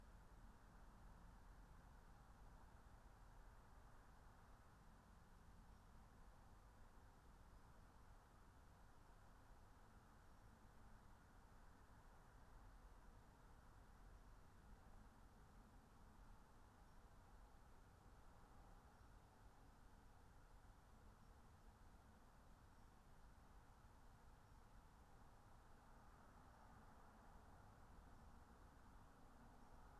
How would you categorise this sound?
Soundscapes > Nature